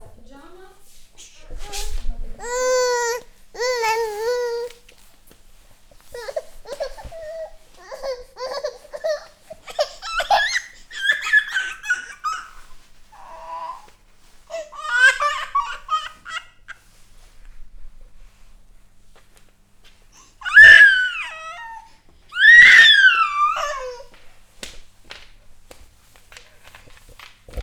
Speech > Solo speech
my baby daughter crying at 11 months. Recorded with Tascam H5
crying, newborn